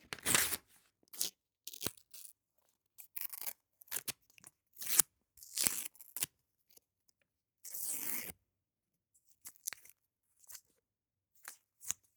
Sound effects > Objects / House appliances
Paper Rip and Stick
Sound of different paper ripping and crumples and sticky noises too. Recorded for infographics with text sliding in and out in grungy style.
book, close, crumple, cut, foley, grunge, infographic, magazine, newspaper, noise, page, paper, rip, ripping, rough, rustle, sheet, shuffle, stick, tear, tearing, text, texture, up